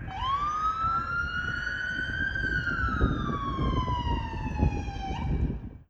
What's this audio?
Sound effects > Vehicles
VEHSirn-Samsung Galaxy Smartphone Police Siren, Going Off Nicholas Judy TDC
A police siren going off.
ambulance, fire-truck, going-off, off, Phone-recording, sounding-off